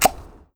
Sound effects > Objects / House appliances
TOONPop-Blue Snowball Microphone, CU Detach Nicholas Judy TDC
A cartoon pop for detaching something.